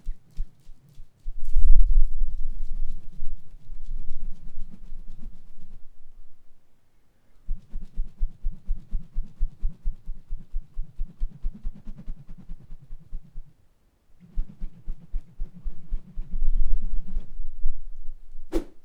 Sound effects > Natural elements and explosions
Subject : Circling a stick in the air over the microphone. After a recording Whoosh session. Date YMD : 2025 04 Location : Indoor Gergueil France. Hardware : Tascam FR-AV2, Rode NT5 Weather : Processing : Trimmed and Normalized in Audacity.